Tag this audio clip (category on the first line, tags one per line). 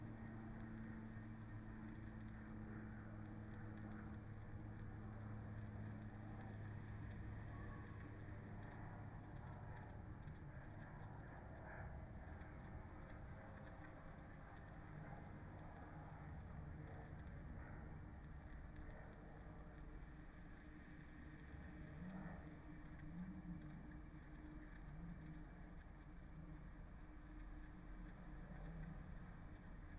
Soundscapes > Nature
Dendrophone
soundscape
alice-holt-forest
weather-data
phenological-recording
artistic-intervention
sound-installation
data-to-sound
natural-soundscape
modified-soundscape
field-recording
nature
raspberry-pi